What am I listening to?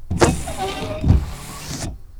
Sound effects > Vehicles
Ford 115 T350 - Windscreen wiper dry (base)
2003, Van, 2025, Vehicle, T350, 115, 2003-model, FR-AV2, August, Tascam, Ford, France, A2WS, Ford-Transit, Mono, Single-mic-mono, SM57, Old